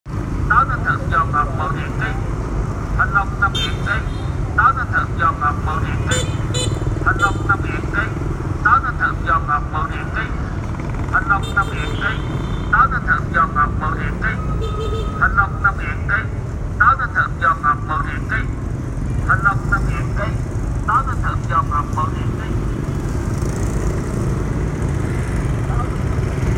Speech > Solo speech
Thành Long 5000 Một Ký, Táo Thanh Đậm Bao Ngọt 10 Ngàn Một Ký
Man sell dragon fruit and apple. Record use iPhone 7 Plus smart phone. 2024.11.11 16:43